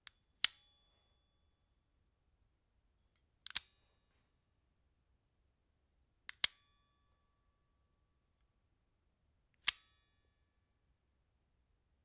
Sound effects > Objects / House appliances
Knipex 41 04 180 locking pliers (Empty bite)
Subject : Recording a Knipex 41 04 180 locking pliers / vice grip. Just handling it in thin air. Date YMD : 2025 July 07 Location : Indoors. Sennheiser MKE600 P48, no filter. Weather : Processing : Trimmed and maybe sliced in Audacity.
clang, cling, close-up, closing, fr-av2, hardware, indoor, locking-pliers, lockingpliers, mke-600, mke600, opening, pliers, Sennheiser, tascam, tool, vice-grip